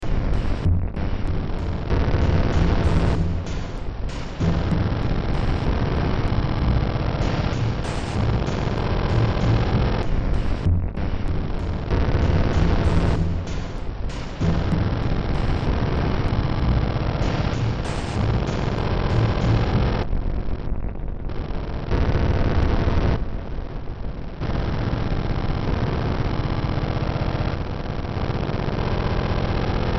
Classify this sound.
Music > Multiple instruments